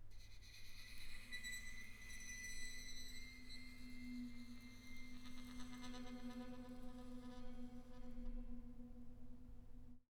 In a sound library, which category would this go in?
Sound effects > Other